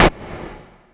Instrument samples > Synths / Electronic
CVLT BASS 98
subs, wavetable, synth, lfo, low, sub, stabs, bassdrop, bass, synthbass, subbass, subwoofer, wobble, lowend, drops, clear